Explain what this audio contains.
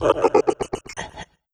Sound effects > Electronic / Design
Strange Ability Echo
A vampire loses 5HP to heal a party member by 10. Random (chaos button) Effectrix effects used (X-Loop being the basis).
scifi, sorcerer, magical, magician, funny, ability, weird, sorcery, abstract, game-design, vst, effect, fun, fantasy, dnd, magic, video-game, dungeons-and-dragons, prank, strange, sound-design, sci-fi, jester, prankster, gaming, spell, RPG, game